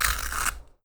Sound effects > Objects / House appliances
CLOTHRip-Blue Snowball Microphone, CU Golf Glove, Velcro, Take Off Nicholas Judy TDC
A golf glove velcro taking off.
velcro, Blue-Snowball, foley, glove, take, golf, off, Blue-brand